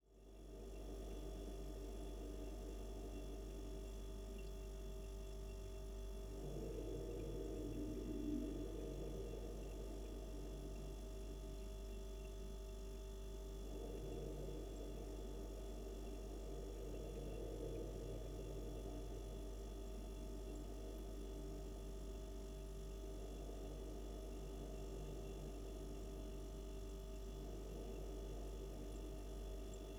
Sound effects > Objects / House appliances
Small hotel refrigerator motor
Small hotel refrigerator running. Recorded on an H2essential.
condenser, hotel, refrigerator, small